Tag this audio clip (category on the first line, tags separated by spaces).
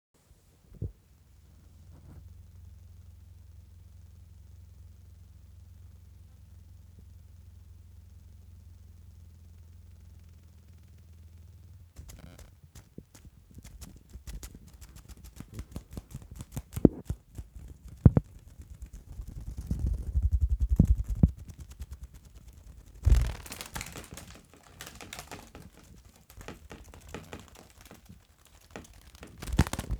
Sound effects > Animals
Mildura moth Rainmoth